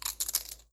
Sound effects > Human sounds and actions
FOODEat-Samsung Galaxy Smartphone Slow Bite into Chip, Doritos Nicholas Judy TDC
A slow bite into a Doritos chip.
chip, foley